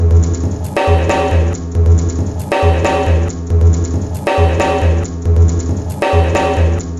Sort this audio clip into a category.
Instrument samples > Percussion